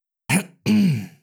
Sound effects > Human sounds and actions
Clearing the throat
Me, clearing my throat.
cough, sick, throat